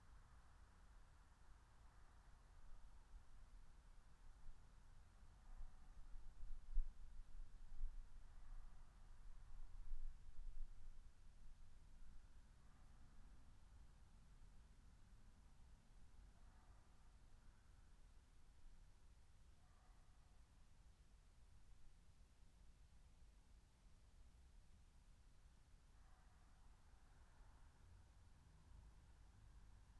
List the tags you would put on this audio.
Soundscapes > Nature
meadow alice-holt-forest raspberry-pi nature natural-soundscape phenological-recording soundscape field-recording

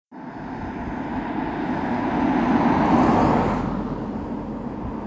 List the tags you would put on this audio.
Sound effects > Vehicles
passing-by moderate-speed studded-tires wet-road asphalt-road car

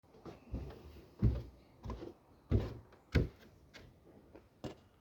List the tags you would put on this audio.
Sound effects > Natural elements and explosions
wood walk floor

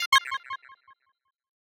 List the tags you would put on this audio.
Sound effects > Electronic / Design
alert digital selection interface message confirmation sci-fi

